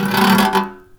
Sound effects > Other mechanisms, engines, machines
Handsaw Tooth Teeth Metal Foley 6
percussion, fx, hit